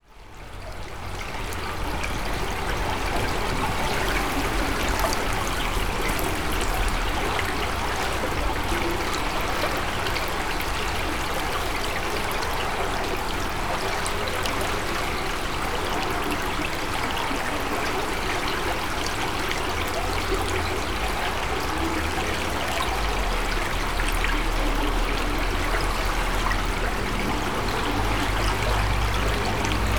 Soundscapes > Nature
A recording of water from a small stream passing through a trash screen.